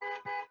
Sound effects > Vehicles
Car horn. Sampled from a voiceover i was doing where my room's window was open. (I.e. recorded with my headset microphone)